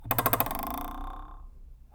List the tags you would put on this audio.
Sound effects > Objects / House appliances
Clang
SFX
ting
Wobble

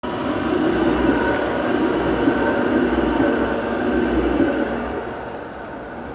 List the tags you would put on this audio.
Soundscapes > Urban
rail
tram
tramway

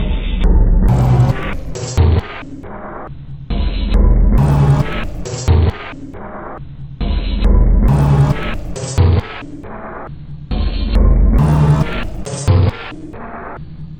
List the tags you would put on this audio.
Instrument samples > Percussion
Loop
Ambient
Weird
Packs
Alien
Samples
Underground
Drum
Soundtrack
Dark
Industrial
Loopable

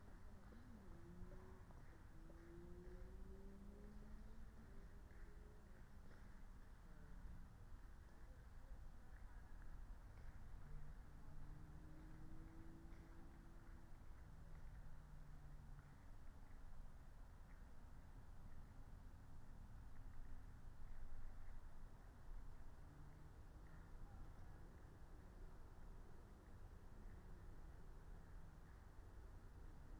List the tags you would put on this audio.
Soundscapes > Nature
alice-holt-forest
field-recording
meadow
natural-soundscape
nature
phenological-recording
raspberry-pi
soundscape

